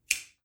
Sound effects > Objects / House appliances
OBJMisc-Samsung Galaxy Smartphone, CU Pocket Knife, Flip Open Nicholas Judy TDC
A pocket knife flipping open.
flip, foley, open, Phone-recording, pocket-knife